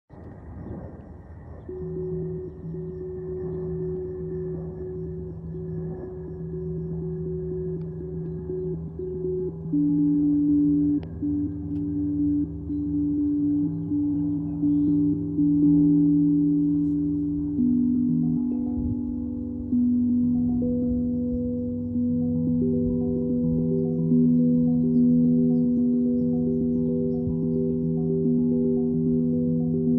Music > Solo instrument
Sentences Unfinished - Ambient Tongue Drum
Ambient, Atmosphere, Background, Dreamscape, Reverb